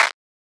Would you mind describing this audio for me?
Instrument samples > Percussion
IDM Clap 1
嗨 ！那不是录制声音:) 我用phasephant合成它！
EDM, Percussion, IDM, Industry, Instrument, Clap